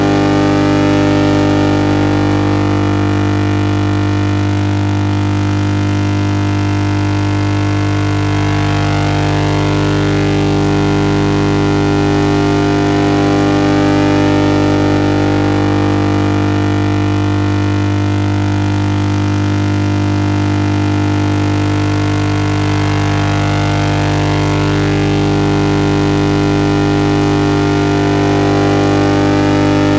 Soundscapes > Other
Pulsing Wave
Electromagnetic recording using SOMA and zoom h2n.
SOMA, pulsating, electrical, noise, appliance, drone, electromagnetic, hum